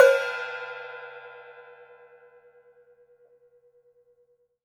Music > Solo instrument
Vintage Custom 14 inch Hi Hat-013
Custom, Cymbal, Cymbals, Drum, Drums, Hat, Hats, HiHat, Kit, Metal, Oneshot, Perc, Percussion, Vintage